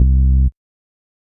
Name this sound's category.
Instrument samples > Synths / Electronic